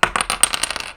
Sound effects > Objects / House appliances
FOLYProp-Blue Snowball Microphone, CU Seashell, Clatter 08 Nicholas Judy TDC
Blue-brand, Blue-Snowball, clatter, foley, seashell